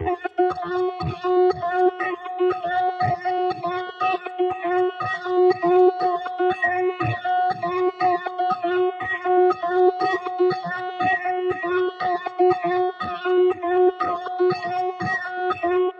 Sound effects > Electronic / Design
Synth Loop 120BPM
Created with Scuplture synth in Logic Pro and a bunch of effects.
Synthesis, 120BPM, synth